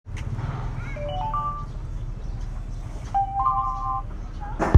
Sound effects > Electronic / Design
Mở Xe Đạp Điện - Start Electric Bike
Start electric bike of student from Quản Cơ Thành school. Record use iPhone 7 Plus smart phone 2025 03.08 07:52